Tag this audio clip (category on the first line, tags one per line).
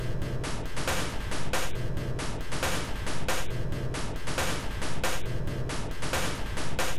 Instrument samples > Percussion
Ambient
Underground
Loop
Samples
Alien
Dark
Weird
Soundtrack
Loopable
Packs
Drum
Industrial